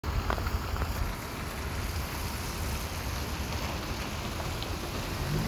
Soundscapes > Urban
A bus passing the recorder in a roundabout. The sound of the bus engine and sound of rain can be heard in the recording. Recorded on a Samsung Galaxy A54 5G. The recording was made during a windy and rainy afternoon in Tampere.